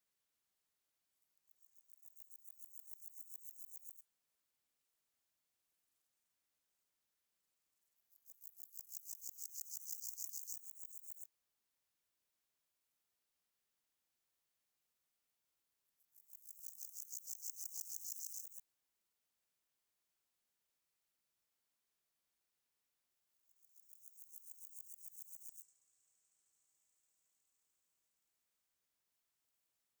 Sound effects > Animals
SFX-Crickets2
SFX of two crickets on a bush. Recorded with iPhone 14 internal microphone, then isolated the sound using iZotope RX.
SFX, France, Insects